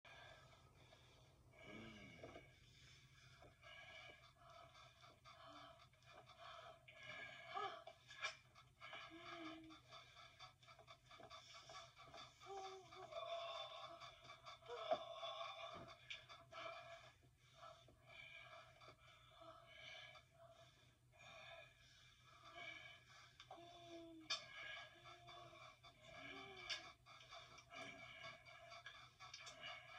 Sound effects > Human sounds and actions
Hotel fuck
staying in a hotel listening to a hot fuck in the room next to me